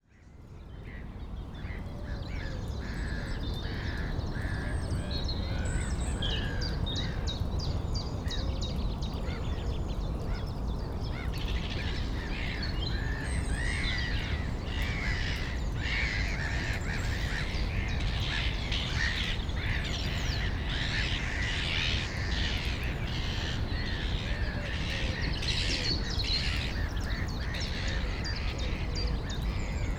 Soundscapes > Nature
An ambience recording at Middleton Lakes, Staffordshire. Day time. Recorded with a Zoom F3 and 2 Em272Z1 Omni directional mics.
recording, nature, ambience, birds